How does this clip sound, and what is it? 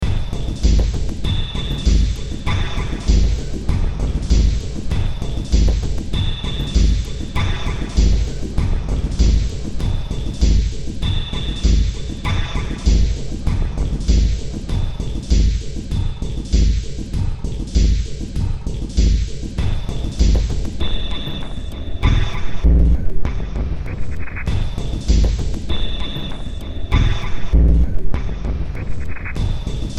Music > Multiple instruments
Demo Track #4025 (Industraumatic)
Soundtrack, Noise, Cyberpunk, Industrial, Underground, Horror, Ambient, Games, Sci-fi